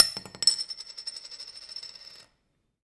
Sound effects > Objects / House appliances
Subject : A beer cam falling over doing the "Eulers disk" effect of just wobbling on the wooden table. Date YMD : 2025 04 06 Location : Saint Assiscle France. Hardware : Zoom H2N, in MS mode. Weather : Processing : Trimmed and Normalized in Audacity. Probably decoded the MS and faded in/out.